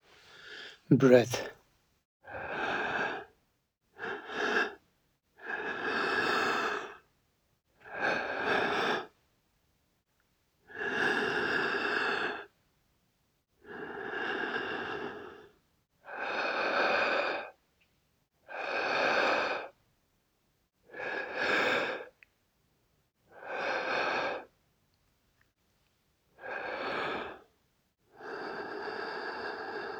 Sound effects > Human sounds and actions
SFX-Breath
Various takes of human breathing, recorded with iPhone inbuilt microphone.
Breath, Human, SFX